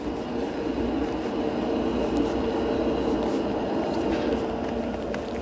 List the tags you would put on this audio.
Soundscapes > Urban
tram vehicle tampere

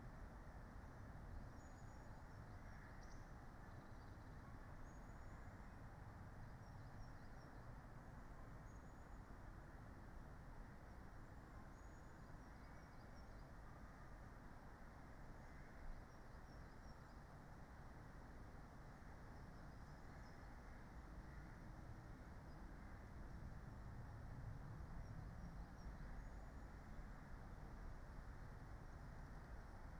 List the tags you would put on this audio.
Soundscapes > Nature
Dendrophone data-to-sound field-recording phenological-recording soundscape artistic-intervention alice-holt-forest natural-soundscape nature raspberry-pi sound-installation weather-data modified-soundscape

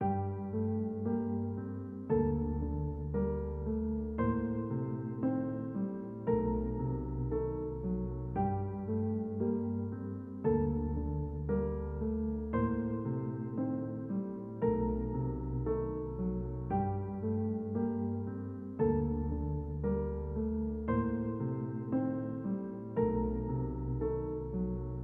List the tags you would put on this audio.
Music > Solo instrument
background instrumental LABS melody piano theme

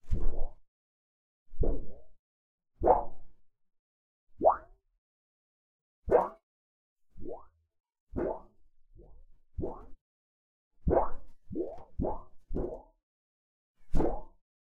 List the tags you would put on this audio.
Sound effects > Other
balance belly boing boobs bounce breast breasts bubble dizziness dizzy doink gelatin jell-o jello plastic sheet squish squishy stomach unbalance wiggle wiggling wobble wobbleboard wobbling

